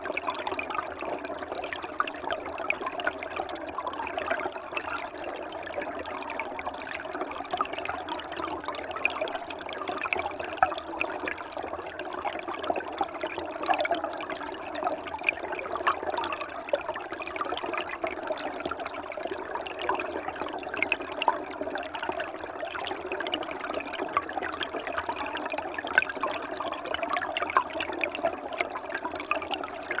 Soundscapes > Other
251006 Albi Ruisseau de Caussels Downstream rock stepbridge - In riffle - Hydrophone resting flat
Subject : Hydrophone recording near the downstream rock stepbridge of Ruisseau de Caussels. Here in the Riffle. Microphone placed under a few rocks to hold it inplace. Date YMD : 2025 October 06 Location : Albi 81000 Tarn Occitanie France. WIth a DIY piezoelectric hydrophone. Weather : Nice sunny day. Low to no wind. Processing : Trimmed and normalised in Audacity. Notes : Thanks to Felix Blume for his help and instructions to build the microphone, and Centre D'art le Lait for organising the workshop to build the DIY hydrophone. Note Rivers are low. Tarn probs has 1m less and Ruisseau Caussels some 30cm less.
under-water, Ruisseau-de-Caussels, FR-AV2, 81000, Hydrophone, Tascam, Albi, riffle, In-riffle